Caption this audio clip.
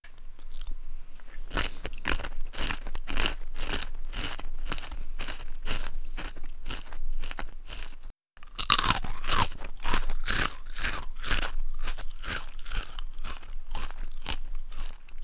Sound effects > Human sounds and actions
eating something crunchy
Me eating something crunshy, first with closed mouth, then with open mouth. Focusing on the crunch and chew trying to not have to many wet noises. Made for a video game project but maybe it'll be useful to others. Could also be used for walking on ground audio maybe. Use for whatever.
crunch, chomp, cereal, munch, walking, eating, chew, eat